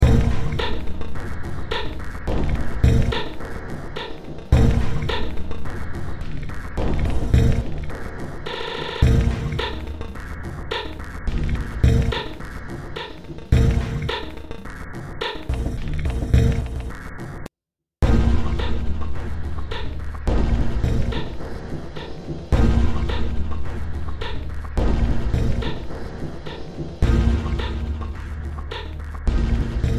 Music > Multiple instruments
Demo Track #3503 (Industraumatic)
Ambient, Cyberpunk, Games, Horror, Industrial, Sci-fi, Soundtrack, Underground